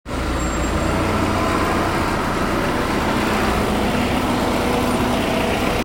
Soundscapes > Urban
Bus leaving 25
Where: Hervanta keskus What: Sound of a bus leaving bus stop Where: At a bus stop in the evening in a calm weather Method: Iphone 15 pro max voice recorder Purpose: Binary classification of sounds in an audio clip
bus, bus-stop, traffic